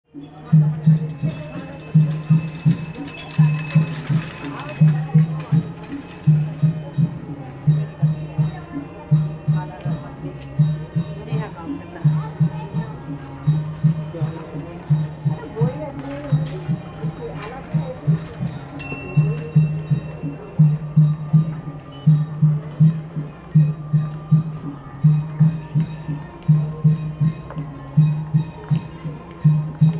Soundscapes > Urban
Loud India (Baby don't cry)
Sound recorded in India where I explore the loudness produced by human activity, machines and environments in relation with society, religion and traditional culture.